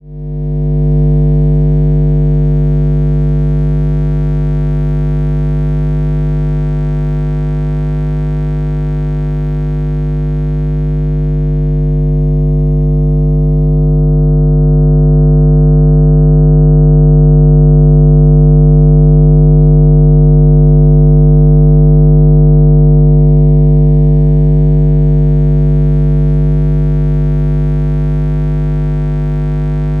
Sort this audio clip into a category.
Sound effects > Objects / House appliances